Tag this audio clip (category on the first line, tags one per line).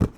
Sound effects > Objects / House appliances
fill
metal
knock
pail
lid
scoop
household
foley
drop
spill
debris
hollow
tool
pour
handle
slam
kitchen
cleaning
water
garden
liquid
plastic
object
carry
clatter
bucket
container
tip
clang
shake